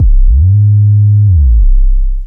Instrument samples > Synths / Electronic
CVLT BASS 179
bassdrop, drops, lfo, low, subs, subwoofer, wavetable, wobble